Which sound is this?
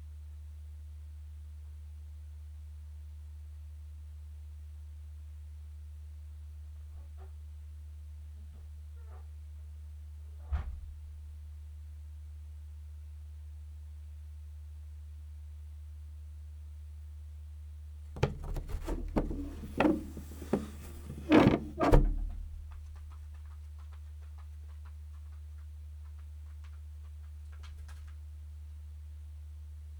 Sound effects > Animals
Cat-flap 6
Subject : A cat flap. it's in between two other doors/cat flaps the cats need to go though. Date YMD : 2025 September 04 In the early morning a bit after 1am. Location : Gergueil 21410 Bourgogne-Franche-Comté Côte-d'Or France. Hardware : DJI Mic 3 TX. Onboard recorder "Original" / raw mode. Weather : Processing : Trimmed and normalised in Audacity.
Gergueil, Cote-dor, cat-flap, pet-door, 21410, France, cat, DJI, door, MIC-3, omni, flap, single-mic, Dji-mic3